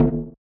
Instrument samples > Percussion
Native Percussions 1 High2
Hi ! That's not recording sound :) I synth it with phasephant!
Bongo, Conga, drum, Enthnic, Native, Percussion